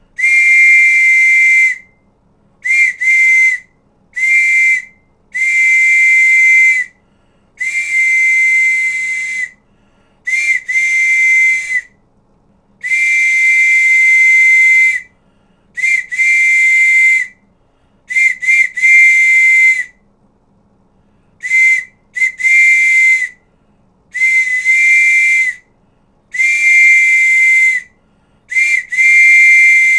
Sound effects > Objects / House appliances
WHSTMech-Blue Snowball Microphone, CU Whistle, Metropolitian, Blow Nicholas Judy TDC
A metropolitian whistle blowing.